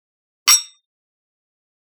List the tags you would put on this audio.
Sound effects > Objects / House appliances
clink party kitchen toast clinking wine glass

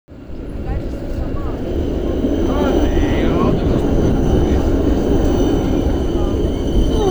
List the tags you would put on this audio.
Sound effects > Vehicles
tram rail vehicle

